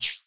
Instrument samples > Percussion
Free Hoops Shaker Shacker Flanger FX - Nova Sound
Immerse yourself in the authentic ambiance of basketball and sports with over 700 meticulously crafted sound effects and percussion elements. From the rhythmic dribbles and graceful swishes to the electrifying shouts and spirited chants, this sound kit covers the full spectrum of the game. Feel the bounce. Hear the game.
Ball, Balling, basketball, Beat, Beats, Classic, Drum, Free, Headphones, HH, Hip, Hoops, Hop, Kit, Live, Logo, Moves, music, Nova, Novahoops, Novasound, Percussion, Rap, Sound, Sports, Trap, Vinyl